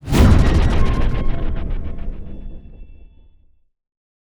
Sound effects > Other
Sound Design Elements Impact SFX PS 046
effects, blunt, power, transient, cinematic, rumble, explosion, sound, collision, hard, heavy, smash, impact, strike, force, game, hit, sfx, audio, crash, percussive, thudbang, shockwave, sharp, design